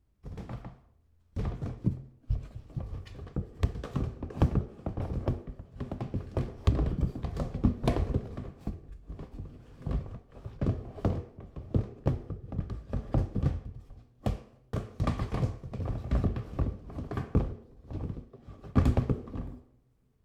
Sound effects > Objects / House appliances
plastic boxes handling and tweaking
Tweaking and moving an empty plastic box inside a larger plastic box. Recorded with Zoom H2.
plastic, box, tweaking